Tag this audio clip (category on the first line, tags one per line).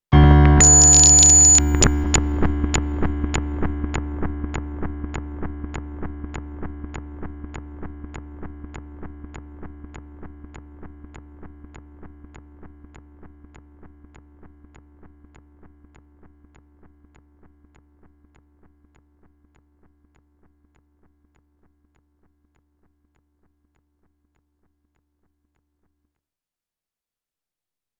Instrument samples > Piano / Keyboard instruments
epiano
broken
Yamaha
malfunction